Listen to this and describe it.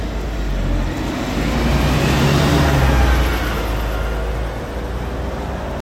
Soundscapes > Urban
City bus engine and passenger transport vehicle. Low-frequency diesel engine rumble. Air-brake hiss during stopping, subtle vibrations from the chassis, tire noise rolling over asphalt. Occasional mechanical rattling and distant urban ambience such as traffic and wind. Recorded on iPhone 15 in Tampere. Recorded on iPhone 15 outdoors at a city bus stop on a busy urban street. Used for study project purposes.
public, bus, transportation